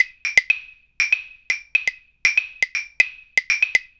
Music > Solo percussion
Three Claves-4
eight loops made from samples of three claves in interesting polyrhythms. Can be used alone or in any combination (they all should sound fine looped together in virtually any order)
120BPM, claves, drum, drums, loop, loops